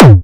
Instrument samples > Percussion
BrazilFunk Kick 25
Retouched WhoDat Kick from flstudio original sample pack. Just tweaked the Boost amount from flstudio sampler. Then just did some pitching work and tweaked the pogo amount randomly. Processed with ZL EQ, OTT, Waveshaper.